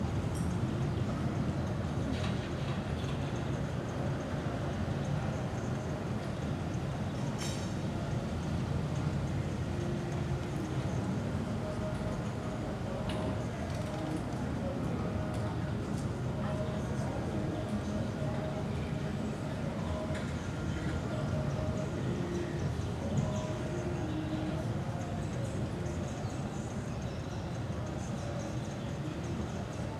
Soundscapes > Urban
Amb afternoon neighborhood Music in background, walla, dogs barking, wind on trees, cars passing, birds Zoom h4n and earsight ommi mics
city, suburban, town, urban